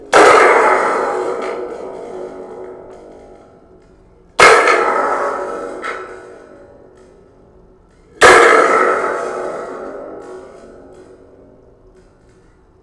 Music > Solo percussion
MUSCPerc-Blue Snowball Microphone, CU Thunder Tube, Strikes, Strong, X3 Nicholas Judy TDC
Three strong thunder tube strikes.
cartoon, three, theatrical, strong, Blue-brand, thunder-tube, strike, Blue-Snowball